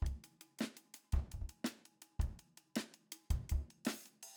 Music > Solo percussion
Short loop 110 BPM in 4
live, loop, drums, kit, studio, recording